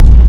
Instrument samples > Percussion
dumpster pre-1
A useless/bad weird drum.
unfit, demolition, useless, moderate, unusable, fair, mediocre, ordinary, commonplace, run-of-the-mill, average, dumpster, cacosample, alienware, unremarkable, middling, pedestrian, so-so